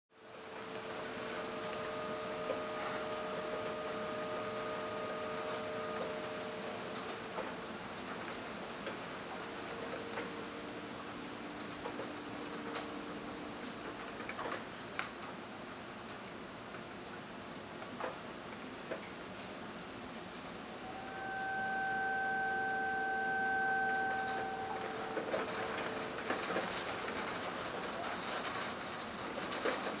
Soundscapes > Urban
Part of my "Aeolian Harp" pack. An interior domestic soundscape, with an aeolian harp playing in the wind as it begins to rain. The aeolian harp sits in a windowsill with the breeze coming in through the window. The aeolian harp is a prototype that I have made, and is constructed of maple plywood and mahogany, with nylon guitar strings tuned in unison to G3. This has been edited, with various cuts crossfaded due to wind noise that I had not accounted for. Featuring:
rain
wind in trees outside, rustling bushes and leaves
sounds of human activity inside and outside, including shuffling of drawing pencils in a case
Recorder: Sony PCM-D50, 90-degree mic pattern, no wind sleeve.